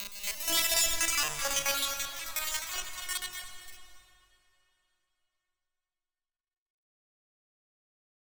Sound effects > Other
Robot-esque voice with reverb, sort of musical